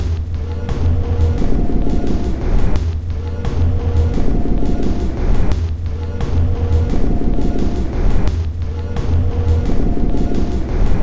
Instrument samples > Percussion
This 174bpm Drum Loop is good for composing Industrial/Electronic/Ambient songs or using as soundtrack to a sci-fi/suspense/horror indie game or short film.
Drum, Packs, Dark, Ambient, Weird, Loopable, Samples, Industrial, Alien, Loop, Underground, Soundtrack